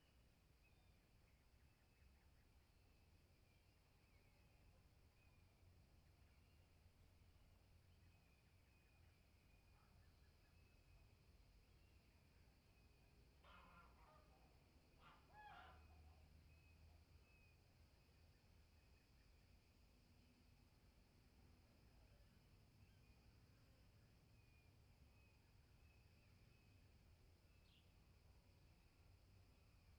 Soundscapes > Nature
Calm countryside atmosphere
Recorded on the island of Andros, Cyclades, Greece, outside a 9th-century monastery. Some distant birds and cicadas can be heard.
ambience, birds, calm, countryside, field, peaceful, recording, relaxing